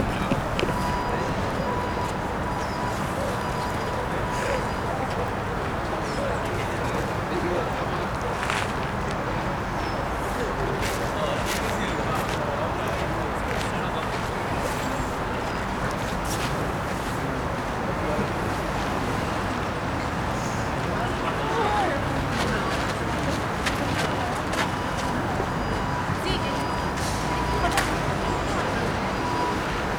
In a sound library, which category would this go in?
Soundscapes > Urban